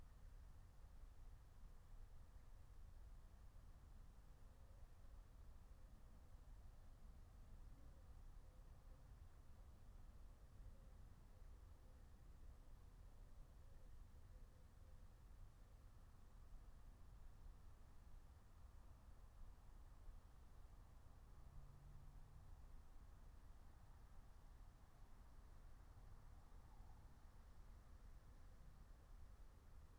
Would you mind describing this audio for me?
Soundscapes > Nature
Automatic recording from a wood near Alice Holt Lodge Pond, Surrey, UK. Recorded with a DIY Raspberry Pi audio streamer designed by Luigi Marino. Before Feb 28th 2025, the recordings were done using MEMs microphones. Since Feb 28th 2025, the quality of the recordings has improved considerably because of changes in the equipment, including switching to Rode LavalierGO mics with Rode AI-Micro audio interface and software updates. This solar-powered system is typically stable, but it may go offline due to extreme weather factors. This recording is part of a natural soundscape dataset captured four times a day according to solar time (sunrise, solar noon, sunset, and at the midpoint between sunset and sunrise). The main tree species is Corsican pine, planted as a crop in 1992, and there are also mixed broadleaf species such as oak, sweet chestnut, birch, and willow. The animal species include roe deer, muntjac deer, and various birds, including birds of prey like buzzard and tawny owl.
alice-holt-forest, field-recording, meadow, natural-soundscape, nature, phenological-recording, raspberry-pi, soundscape